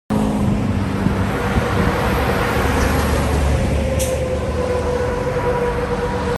Sound effects > Vehicles
Sun Dec 21 2025 (18)
highway, road, truck